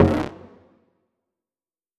Sound effects > Electronic / Design
LoFi Grunt-01
Lofi, sudden and short grunt. Retro-esque sound emulation using wavetables.
animal, belch, grunt, lofi, monster, retro, synth